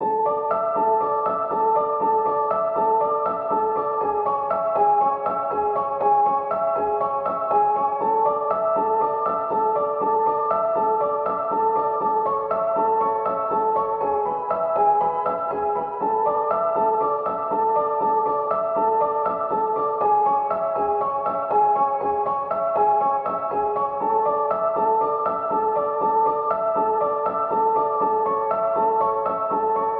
Music > Solo instrument
Piano loops 181 efect 4 octave long loop 120 bpm
120, 120bpm, free, loop, music, piano, pianomusic, reverb, samples, simple, simplesamples